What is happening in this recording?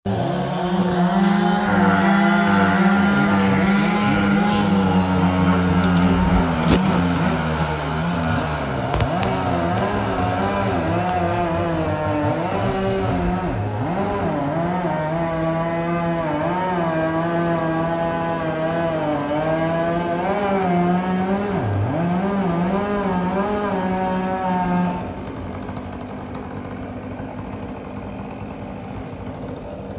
Sound effects > Other mechanisms, engines, machines
active start/ pause chainsaws in use cutting large pine tree at about 200 meters on wooded hillside. recorded with iphone sound app.
Logging, ChainSaw, Engines